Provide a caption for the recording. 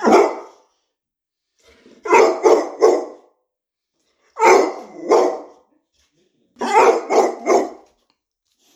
Sound effects > Animals
A dog barking and yipping. Performed by Jasper, the Judy family's dog.